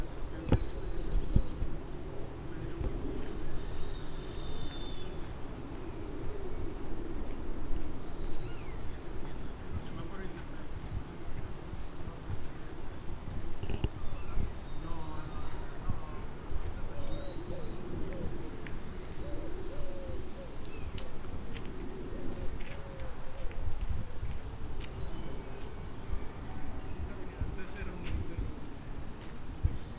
Soundscapes > Nature
20250312 Collserola Birds People Nice
Collserola, Nice